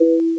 Sound effects > Electronic / Design
note E blip electro
from a scale of notes created on labchirp for a simon-type game of chasing sounds and flashes.
blip, electro, scales, sinfgle-note, tonal